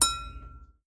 Sound effects > Objects / House appliances
FOODGware-Samsung Galaxy Smartphone, CU Glass Ding 04 Nicholas Judy TDC
A glass ding.